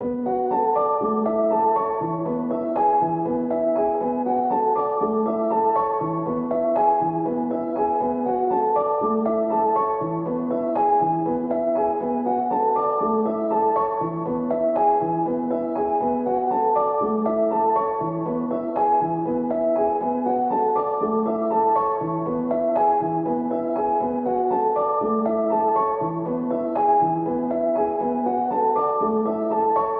Music > Solo instrument
Piano loops 138 efect 4 octave long loop 120 bpm
music, free, samples, reverb, piano, pianomusic, simplesamples, 120, 120bpm, simple, loop